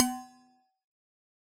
Sound effects > Objects / House appliances
Resonant coffee thermos-033
percusive, sampling, recording